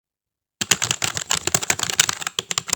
Sound effects > Objects / House appliances
Sound of PC keyboard